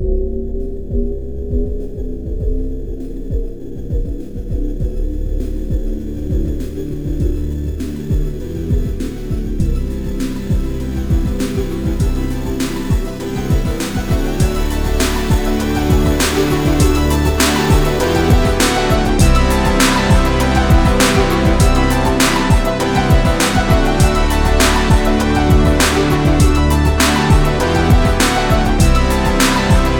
Music > Multiple instruments
Slowly Evolving Beat #2 (100 bpm, 16 Bars)
100-bpm, 100-bpm-16-bars, 100-bpm-beat, 100-bpm-drum-beat, 100-bpm-drum-loop, 100-bpm-evolving-beat, 100-bpm-loop, 16-bar-beat, 16-bars, ambient-evolving-beat, crescendo, crescendoing-beat, Dylan-Kelk, evolving-beat, podcast, podcast-intro, slowly-evolving-beat